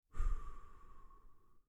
Sound effects > Human sounds and actions
shot blow long 1
It's a sound created for the game Dungeons and Bubbles for The Global Game Jam 2025.
long, blow, foley, pomper, bubble, fxs, shot